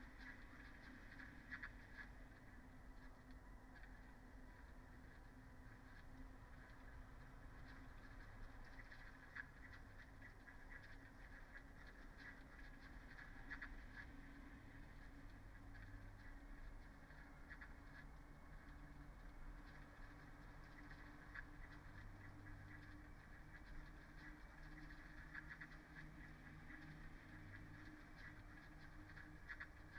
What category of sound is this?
Soundscapes > Nature